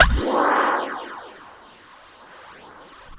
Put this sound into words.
Sound effects > Experimental
snap crack perc fx with verb -glitchid 003
alien, glitch, impact, otherworldy